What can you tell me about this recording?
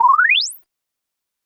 Sound effects > Electronic / Design
SFX Rise2
Attempt on SFXR to make a buff sound effect like the ones in a rpg, except it sounds like that unlike the barrier one.
sfx, abstract, artificial, soundeffect, powerup